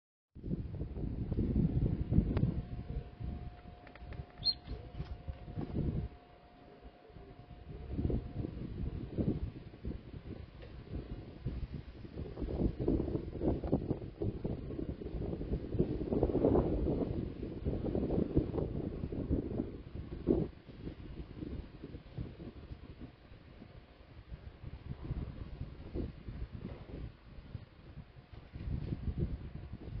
Soundscapes > Nature
Immerse yourself in a 200-minute uncut soundscape extracted from my original video that captures the breath-taking beauty of bright, bubbly clouds moving dynamically across the sky just after a rain shower, filmed from with my phone (Samsung Galaxy s22) from a window overlooking tree tops and shrubs.